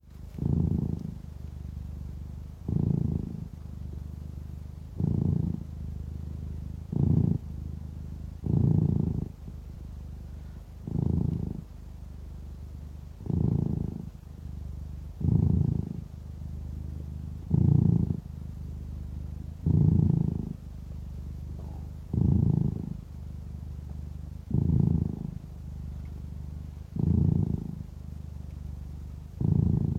Soundscapes > Other
Cat purring under blanket
My cat purring loudly whilst kneading hidden under a blanket. There's also a moment near the end where she's sniffing something whilst purring. Did some minor cleanup to remove shuffling noises caused by moving the phone around.
purring, purr, domestic, cat